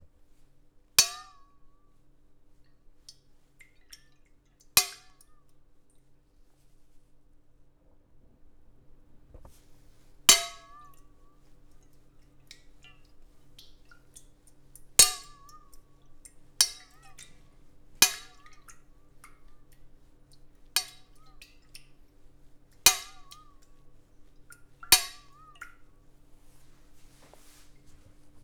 Sound effects > Objects / House appliances
Small saucepan with water
The sound a saucepan with a bit of water makes after being hit and swished around. Good to create sci-fi effects. Recorded with the integrated microphones on a Zoom H5, stereo settings.
h5, water, sci-fi